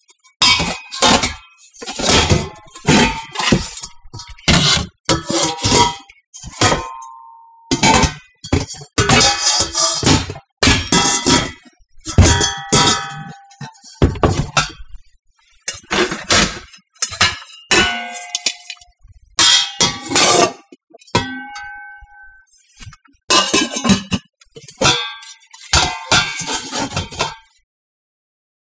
Sound effects > Objects / House appliances
pots-stacking
Metal pots clanging as they are placed inside one another. Recorded with Zoom H6 and SGH-6 Shotgun mic capsule.
pot, clink, sliding, metal, kitchen